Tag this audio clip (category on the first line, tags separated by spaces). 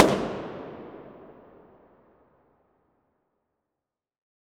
Soundscapes > Urban
Acoustics Balloon City Data Finland Hall IR Parking Pop Response Reverb Sample